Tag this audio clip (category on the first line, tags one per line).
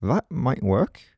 Speech > Solo speech
2025
Adult
Calm
FR-AV2
Generic-lines
Hypercardioid
july
Male
might
MKE-600
MKE600
Sennheiser
Shotgun-microphone
Single-mic-mono
Tascam
thinking
Voice-acting
work